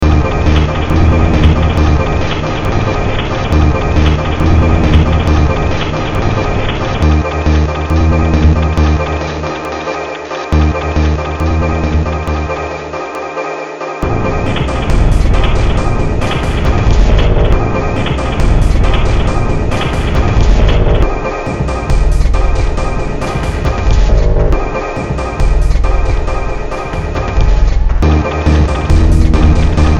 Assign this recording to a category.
Music > Multiple instruments